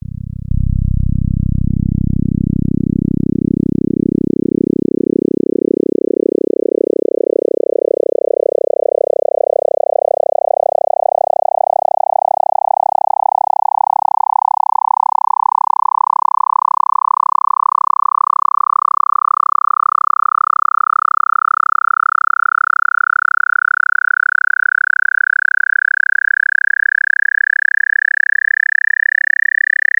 Instrument samples > Synths / Electronic
07. FM-X RES2 SKIRT4 RES0-99 bpm110change C0root
FM-X, MODX, Montage, Yamaha